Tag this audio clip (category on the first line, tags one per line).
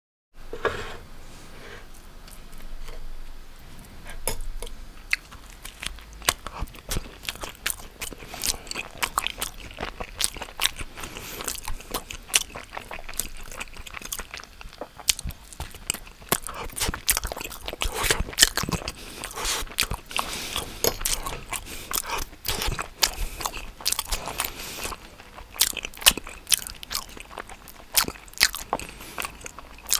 Speech > Other
asmr
dinner
eat
eating
food
fork
meal
plate
smacking